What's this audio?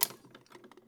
Sound effects > Other mechanisms, engines, machines
Woodshop Foley-060
tools, sfx, foley, shop, tink, oneshot, pop, little, sound, boom, rustle, metal, bang, knock, crackle, wood, percussion, strike, thud, perc, fx, bop, bam